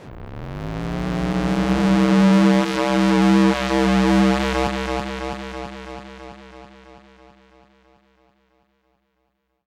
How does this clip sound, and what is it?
Sound effects > Experimental
Analog Bass, Sweeps, and FX-064
alien, analog, analogue, basses, effect, electronic, oneshot, retro, robotic, snythesizer, sweep, vintage